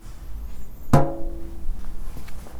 Sound effects > Other mechanisms, engines, machines
Woodshop Foley-081
bam, bang, boom, bop, crackle, foley, fx, knock, little, metal, oneshot, perc, percussion, pop, rustle, sfx, shop, sound, strike, thud, tink, tools, wood